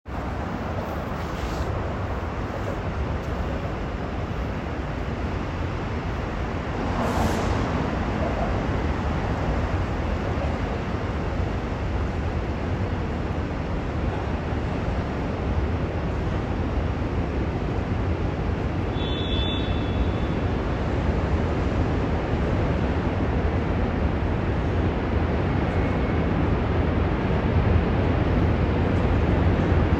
Soundscapes > Urban
Two Subway Train passing overhead on the Manhattan Bridge. I use this sound as background sound for videos set in a city.
Bridge, Cinematic, Free, Locomotive, Manhattan, Motorway, Movie, Passing, Public, Ride, Subway, Trains, Transport, Transportation